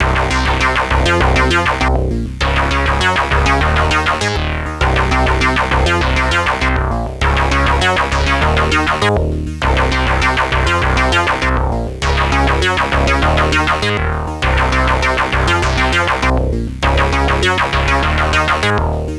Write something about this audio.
Music > Solo instrument
100 D# SK1Polivoks 01
Electronic, Loop